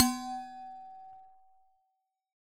Sound effects > Objects / House appliances
Resonant coffee thermos-024
percusive, recording, sampling